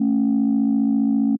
Instrument samples > Synths / Electronic
Landline Phonelike Synth A4
Synth, Tone-Plus-386c, Landline-Telephone-like-Sound, Landline-Telephone, Landline-Phone, Holding-Tone, Old-School-Telephone, just-minor-third, JI-3rd, Landline-Phonelike-Synth, JI, JI-Third, Landline, Landline-Holding-Tone, just-minor-3rd